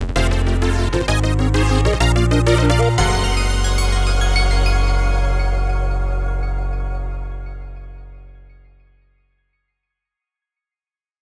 Music > Multiple instruments
Victory Fanfare (8-Bit Thunder) 2
This mix has a little bit of 8-bit distortion added to it.